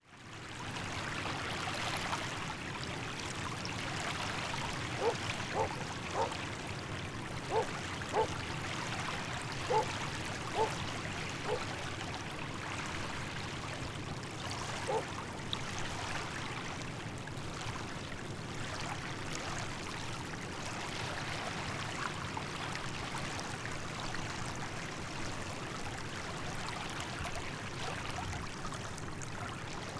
Soundscapes > Nature
waves in the bay - 12.25
Small waves lap against the shore of the Los Osos bay in the evening.
estuary, field-recording, water, waves